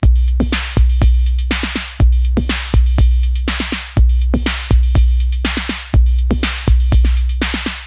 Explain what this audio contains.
Music > Solo percussion
122 606Mod Loop 01
Loop, DrumMachine, Vintage, Drum, Kit, Bass, Mod, music, 606, Modified, Electronic, Synth, Analog